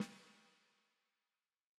Music > Solo percussion
Snare Processed - Oneshot 51 - 14 by 6.5 inch Brass Ludwig
snaredrum, fx, rimshot, snares, processed, beat, kit, drumkit, realdrums, drums, hits, reverb, percussion, snare, oneshot, drum, ludwig, crack, acoustic, sfx, perc, flam, roll, snareroll, rimshots, rim, realdrum, hit, brass